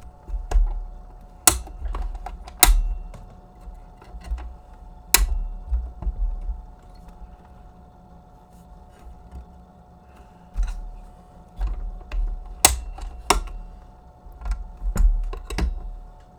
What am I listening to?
Sound effects > Other mechanisms, engines, machines
COMAv-Blue Snowball Microphone, CU Projector, Film, 8mm, Super 8, Position Reel Arm Nicholas Judy TDC
Bell and Howell 8mm-Super 8 film projector positioning reel arms.